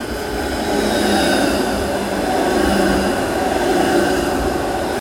Sound effects > Vehicles
tram rain 15
motor, tram